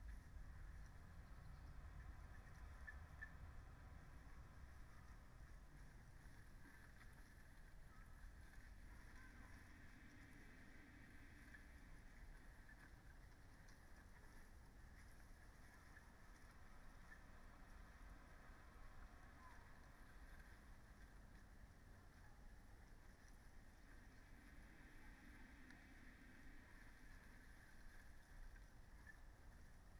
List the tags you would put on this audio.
Soundscapes > Nature
modified-soundscape
phenological-recording
sound-installation
raspberry-pi
soundscape
data-to-sound
artistic-intervention
alice-holt-forest
field-recording
weather-data
Dendrophone
nature
natural-soundscape

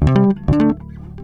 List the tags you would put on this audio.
Instrument samples > String
bass
blues
charvel
electric
funk
fx
loop
loops
mellow
oneshots
pluck
plucked
riffs
rock
slide

